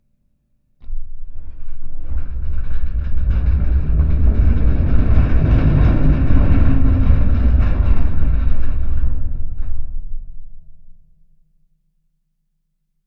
Sound effects > Other
metal rattle push 2
shopping cart being pushed. recorded with a zoom F3, homemade piezo pickup, and a diy piezo preamp kit